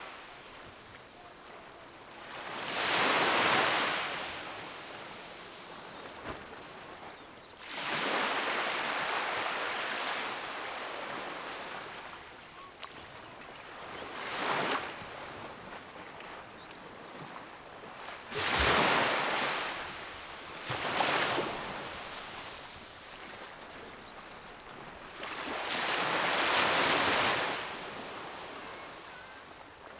Sound effects > Human sounds and actions
MALLORCA BEACH 07
Recorded on a small beach on the south of the Island. Some waves and some sounds of the water hitting against rocks. People can be heard sometimes down the beach. Recorded with a Zoom H6 and compressed slightly
mallorca, beach, waves